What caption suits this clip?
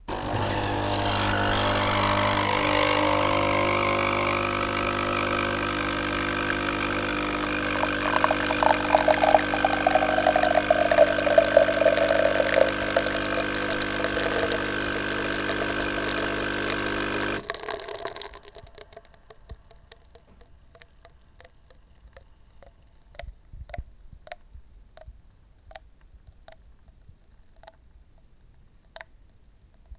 Sound effects > Objects / House appliances
Nespresso Machine Making Coffee and Coffee Drops
The sound of an espresso coffee machine making coffee in the morning. At the end, there is also the sound of the coffee drops into the cup. Recorded with a zoom h1 essential.
bar, coffee, drops, espresso, italian, italy, machine, nespresso